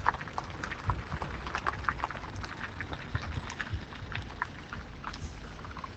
Sound effects > Vehicles
slow moving car wintertyres2
Car with studded tyres moving at idle speed on a paved parking lot. Recorded in an urban setting on a parking lot in near-zero temperature, using the default device microphone of a Samsung Galaxy S20+.
car, asphalt